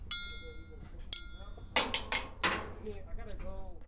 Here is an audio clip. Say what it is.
Sound effects > Objects / House appliances
Junkyard Foley and FX Percs (Metal, Clanks, Scrapes, Bangs, Scrap, and Machines) 89
waste, rubbish, Bash, Perc, Smash, FX